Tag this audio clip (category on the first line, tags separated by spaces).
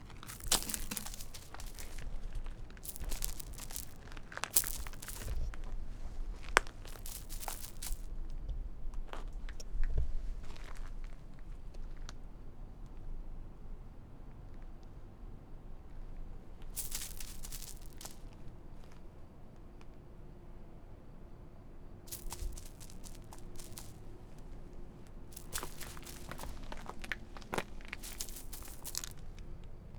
Sound effects > Animals
Rode Early-morning NT5-o gravel 81000 Omni France City August Single-mic-mono night Mono 2025 Albi Tarn frog Occitanie hopping NT5o FR-AV2 Tascam